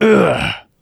Speech > Solo speech
Man Being Hurt
That's gotta hurt. Male vocal recorded using Shure SM7B → Triton FetHead → UR22C → Audacity → RX → Audacity.
emotion, emotional, hurt, interjection, male, man, masculine, pain, painful, scream, screaming, shouting, vocal, voice, yell